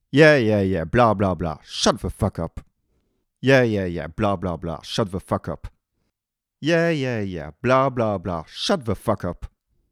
Speech > Solo speech
Subject : A mid 20s dude saying Yeah Yeah Yeah blah blah blah shut the fuck up. Date YMD : 2025 06 05 - 16h Location : Albi France Hardware : Tascam FR-AV2, Shure SM57 with A2WS wind-cover. Weather : Sunny day, mostly blue ideal pockets of clouds. 23°c 10km/h wind. Processing : Trimmed and Normalized in Audacity. Probably some fade in/out.